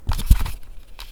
Sound effects > Objects / House appliances
perc percussion foley oneshot glass mechanical drill sfx fx stab hit bonk clunk metal natural fieldrecording foundobject object industrial